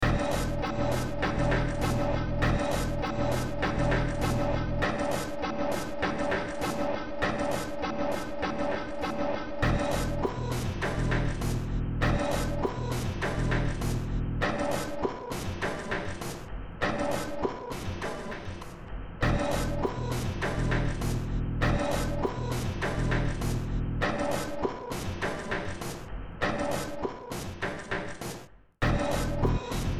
Music > Multiple instruments
Short Track #3188 (Industraumatic)
Games, Cyberpunk, Soundtrack, Underground, Ambient, Noise, Horror, Industrial, Sci-fi